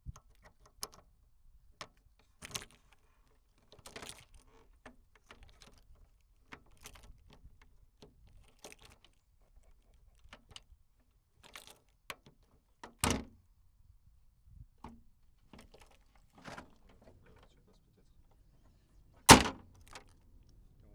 Sound effects > Vehicles
Ford 115 T350 - Hood open and close
115, 2003, 2003-model, 2025, A2WS, August, Ford, Ford-Transit, France, FR-AV2, Mono, Old, Single-mic-mono, SM57, T350, Tascam, Van, Vehicle